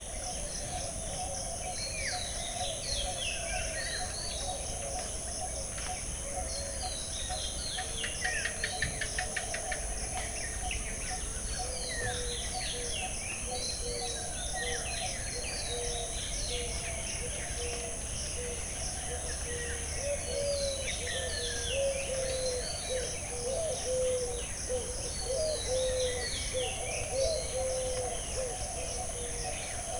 Soundscapes > Nature
2025-04-28 Birbhum Birdsong EarlyMorning SB
Recorded at dawn around 5 a.m., in the peak April heat of 2025, from a densely forested private farm in a village in Birbhum, West Bengal.
Bengal, Langur, Birdsong